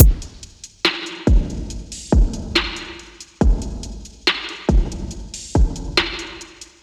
Instrument samples > Percussion
Slow Trap loop
Slow trap with effect